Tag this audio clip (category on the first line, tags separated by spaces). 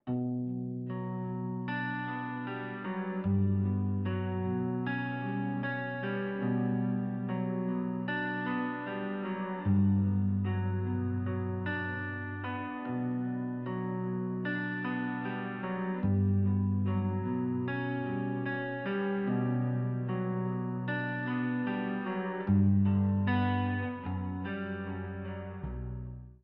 Music > Other
electric sample guitar depressive BM